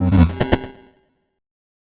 Sound effects > Electronic / Design
Jumpscare (Startling Static) 2
horror-stab, jumpscare-sound-effect, horror-impact, jumpscare-sound, horror-sting, horror-hit, raw-shock, jumpscare-noise, cinematic-hit, spooky-sound, startling-sound